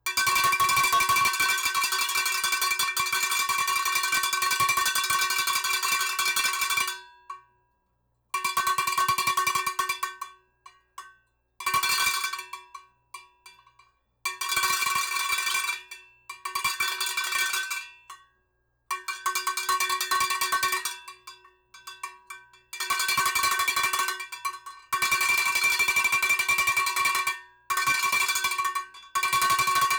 Music > Solo instrument
A cowbell ringing.